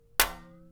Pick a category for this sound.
Sound effects > Other mechanisms, engines, machines